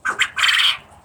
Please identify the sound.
Sound effects > Animals
Fowl - Japanese Quail; Trill, Take 2
Recorded with an LG Stylus 2022
fowl, Japanese, bird, poultry, quail